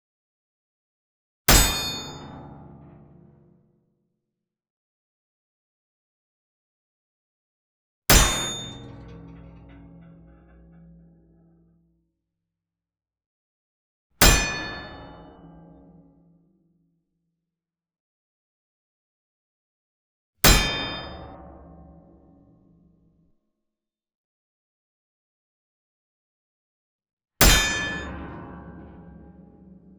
Sound effects > Objects / House appliances
Custom yasmoasa koyama heaven feel heavy sword hit
custom heavy sword sounds inspired by fate/stay night heaven's feel. can be used for characters who are all powerful fight in a godly sword fight. sounds that I used. happy video editing.